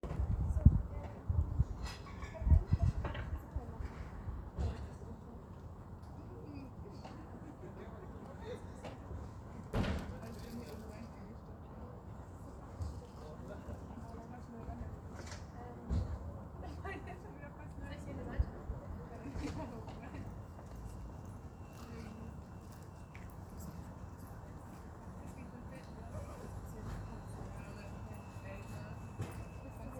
Soundscapes > Urban
Parking lot sounds 2
audio recording made with a phone from an open window above a small Rema parking lot. Capturing an array of sounds that can be heard there on a daily basis.